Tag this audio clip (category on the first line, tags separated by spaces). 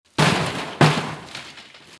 Sound effects > Other
crash glass smash